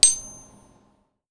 Music > Solo percussion
MUSCInst-CU Tuning Fork, Muted Nicholas Judy TDC
A muted tuning fork.
ding, hit, muted, tuning-fork